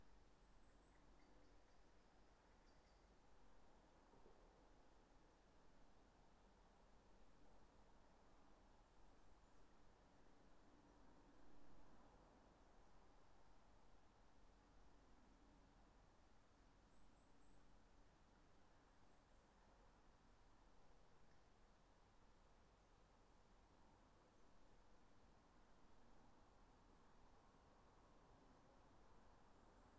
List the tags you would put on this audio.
Soundscapes > Nature
natural-soundscape
Dendrophone
sound-installation
raspberry-pi
data-to-sound
field-recording
alice-holt-forest
nature
phenological-recording
modified-soundscape
soundscape
weather-data
artistic-intervention

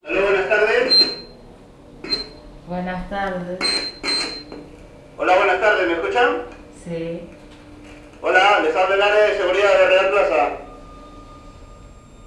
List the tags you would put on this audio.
Speech > Solo speech
GUARD
VOCAL
SECURITY
field-recording
lima
peru

